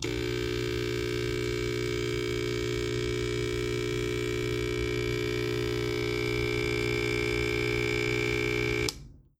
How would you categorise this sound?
Sound effects > Objects / House appliances